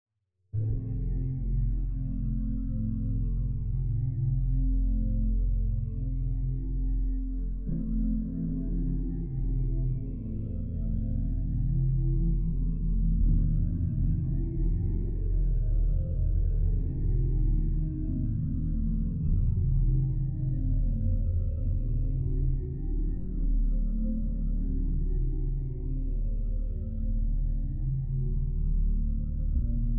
Music > Solo instrument
Space chords
Some chords i played on my electric piano connected to my computer while using a synth plugin, as a part of a music project. Feautures some disintegration at the end, that is just granular processing of the same sound.
synth, Ambient, chord, Ethereal